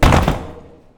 Sound effects > Objects / House appliances
My own recording, recorded on a zoom box